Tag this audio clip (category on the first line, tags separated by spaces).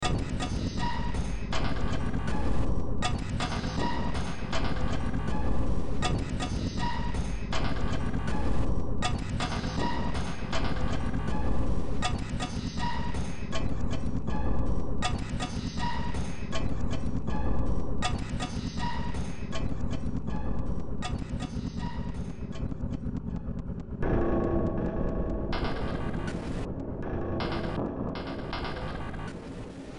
Music > Multiple instruments
Industrial Ambient Noise Sci-fi Cyberpunk Games Horror Underground Soundtrack